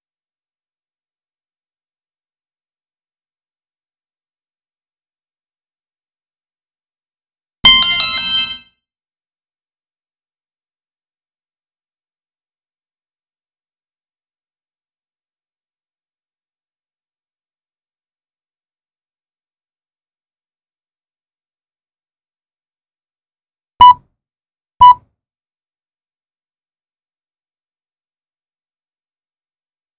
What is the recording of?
Sound effects > Objects / House appliances
Wi-Fi camera pairing
Process sound when connecting a Wi-Fi camera for the first time
pairing, wifi